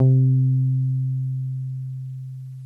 Instrument samples > String
Clean bass i made in famistudio.